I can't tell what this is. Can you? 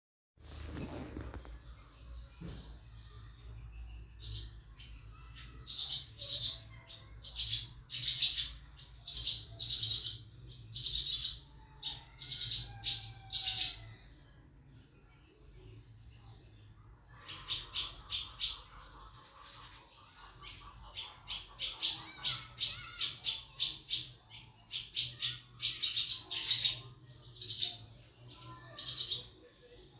Soundscapes > Nature
A large group of common swallows (hiruma rustica) at a farm in Apeldoorn, Netherlands.
rustica, hiruma, Swallows